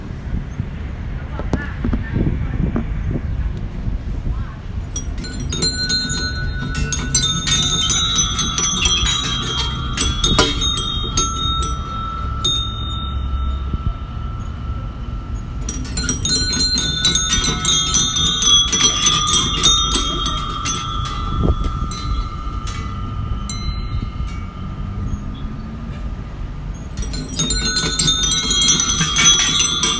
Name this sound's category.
Soundscapes > Urban